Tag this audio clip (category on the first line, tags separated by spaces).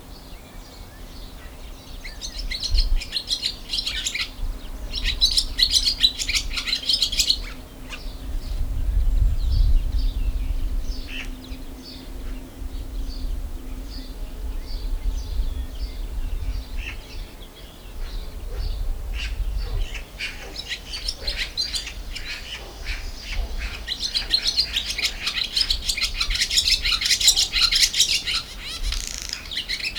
Soundscapes > Urban
Ambience April Gergueil H5 Outdoor Rural Spring Village Zoom